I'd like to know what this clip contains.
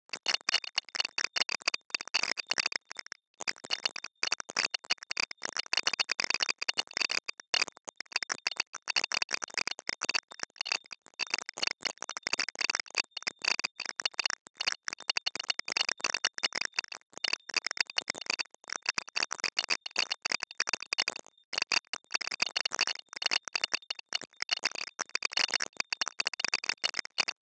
Sound effects > Electronic / Design
Sample used from bandlab. Synthed with phaseplant granular. Processed with Khs Ring Mod, Zl EQ.